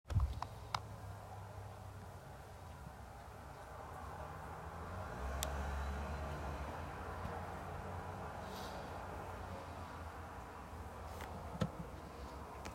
Sound effects > Vehicles
Midday City Ambience
Sounds of a city through an open window of a bedroom.
house, Street, Traffic, ambience, City